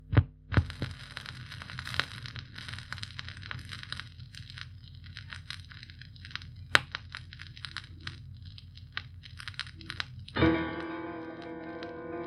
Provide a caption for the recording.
Sound effects > Objects / House appliances
Vinyl spining no music / Sonido vinilo girando sin música
Sound of vinyl before starts the music / Sonido del vinilo antes de que empiece la música.
hiss, pops, record, scratch, vinilo, vinyl